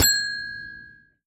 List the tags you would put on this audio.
Sound effects > Objects / House appliances
bell desk Phone-recording ring

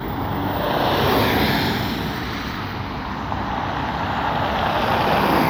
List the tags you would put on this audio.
Soundscapes > Urban
vehicle car

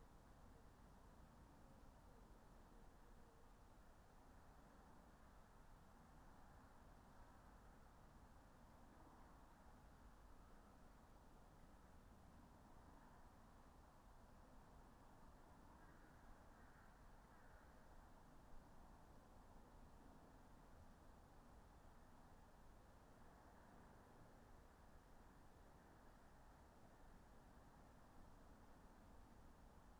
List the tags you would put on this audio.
Soundscapes > Nature
field-recording sound-installation nature weather-data Dendrophone phenological-recording soundscape raspberry-pi alice-holt-forest data-to-sound natural-soundscape

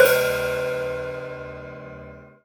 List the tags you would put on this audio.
Music > Solo instrument
Kit Drums Percussion Hat HiHat Custom Oneshot Perc Metal Hats Cymbal Drum Cymbals Vintage

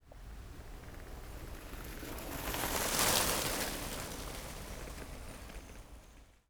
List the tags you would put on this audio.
Sound effects > Vehicles
stereo bike

